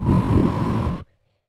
Sound effects > Other
spell wind b
9 - Average Wind Spells Foleyed with a H6 Zoom Recorder, edited in ProTools
medium, spell, wind